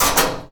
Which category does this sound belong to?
Sound effects > Objects / House appliances